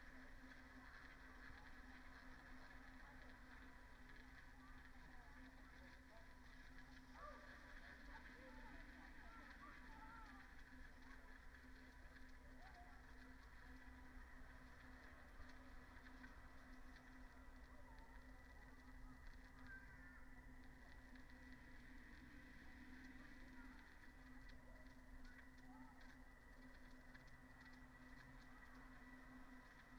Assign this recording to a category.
Soundscapes > Nature